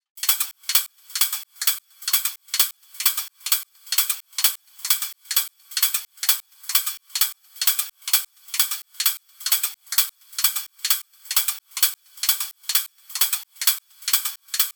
Music > Solo percussion
perc loop offer up 130
offbeat hat groove for techno or house made in phaseplant and snapheap. 130 bpm.
dance, hats, house, loop, perc, techno